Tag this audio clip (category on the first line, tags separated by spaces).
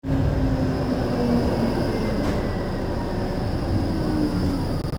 Soundscapes > Urban
transport tram